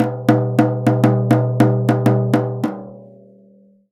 Music > Solo instrument
Toms Misc Perc Hits and Rhythms-012
Crash, Custom, Cymbal, Cymbals, Drum, Drums, FX, GONG, Hat, Kit, Metal, Oneshot, Paiste, Perc, Percussion, Ride, Sabian